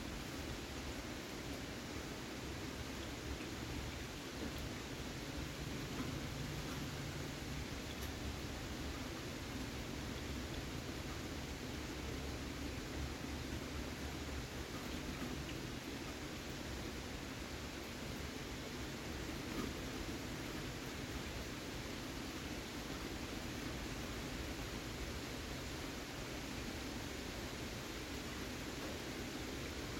Soundscapes > Nature
RAIN-Samsung Galaxy Smartphone Heavy, Rumbling Wind Nicholas Judy TDC
Heavy rain, rumbling wind.
nature, rain